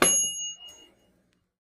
Sound effects > Objects / House appliances
Tiiin! SFX
I Just recorded a sound of my airfryer.
FX, SFX, Short, Sounds